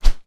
Sound effects > Objects / House appliances
Whoosh - Plastic Hanger 2 (Outer clip) 4
Subject : Whoosh from a plastic clothe hanger. With clips adjustable across the width of it. I recorded whooshes with the clip on the outer edge and near the center hanger. Date YMD : 2025 04 21 Location : Gergueil France. Hardware : Tascam FR-AV2, Rode NT5 pointing up and towards me. Weather : Processing : Trimmed and Normalized in Audacity. Probably some fade in/out.
Airy, coat-hanger, Fast, FR-AV2, Hanger, NT5, Plastic, Rode, SFX, swing, swinging, Tascam, Transition, Whoosh